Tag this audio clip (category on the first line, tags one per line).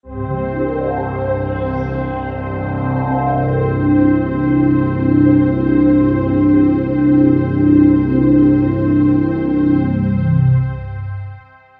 Instrument samples > Synths / Electronic
pad
space-pad
cinematic
space
synth
ambient
C4